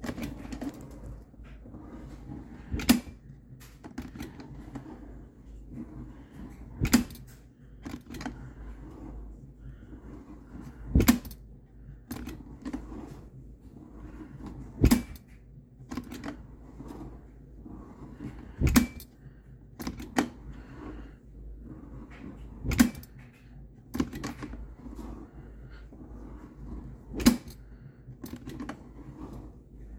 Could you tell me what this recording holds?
Sound effects > Objects / House appliances
DOORAppl-Samsung Galaxy Smartphone, CU Dishwasher Door, Open, Close Nicholas Judy TDC
A dishwasher door opening and closing.
close, dishwasher, door, foley, open, Phone-recording